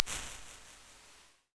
Instrument samples > Synths / Electronic
IR (Analog Device) - Late 90s Soundcraft Signature 12 - HALL N DELAY
Analog, Reverb